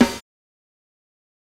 Instrument samples > Percussion
Snot Shot Snare
SJC Alpha Hammered Copper 6.5 x 14 inch Snare on shot sample!